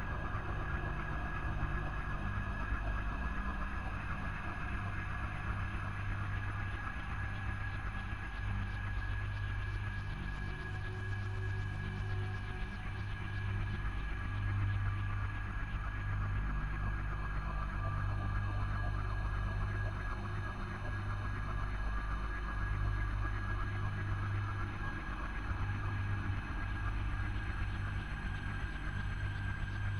Soundscapes > Synthetic / Artificial
Horror Atmosphere 16 Collision Course Loop
in-danger, Dark-Ambience, Dark-Atmosphere, Horror-Atmosphere, tension, Horror-Ambience, action-thriller, not-safe, Seamless-loop, Collision-course, Horror